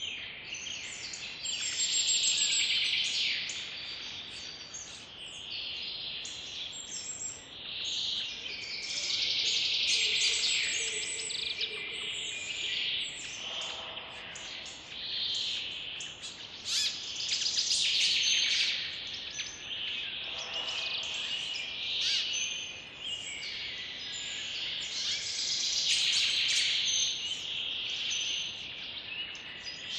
Soundscapes > Nature
Forest atmosphere 003(localization Poland)
rural, atmosphere, ambient, soundscape, Poland, environmental, calm, nature, wild, birdsong, natural, background, European-forest, peaceful, outdoor, birds, ambience, forest, field-recording